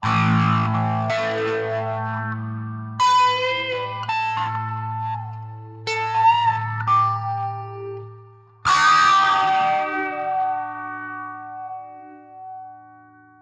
Music > Solo instrument
Free soul guitar wahwah
This is a real guitar riff using amplitube 5 wahwah . Ask me for special sounds
electric, guitar, wah